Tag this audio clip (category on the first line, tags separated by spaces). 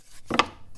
Sound effects > Other mechanisms, engines, machines
bam bang bop foley fx oneshot perc rustle sfx shop strike thud wood